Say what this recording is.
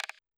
Music > Solo percussion
guitar percussion shot
guitar, techno, acoustic